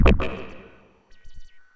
Instrument samples > Synths / Electronic
Benjolon 1 shot11
1SHOT, BENJOLIN, CHIRP, DIY, DRUM, ELECTRONICS, NOISE, SYNTH